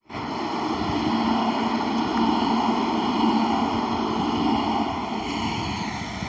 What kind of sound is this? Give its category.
Sound effects > Vehicles